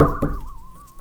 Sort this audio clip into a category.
Sound effects > Other mechanisms, engines, machines